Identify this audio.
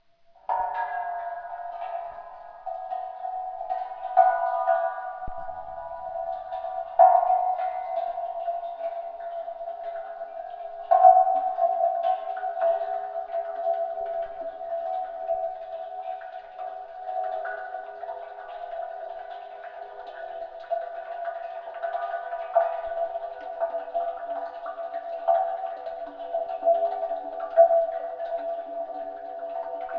Sound effects > Experimental
contact mic in metal thermos, fill slowly with metallic ring pitched down with reverb
This is one of the original recordings of water slowly filling the thermos pitched down a bunch and with some added reverb. Recorded with a contact microphone.
contact-mic, contact-microphone, experimental, thermos, water, water-bottle